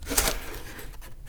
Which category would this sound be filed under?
Sound effects > Other mechanisms, engines, machines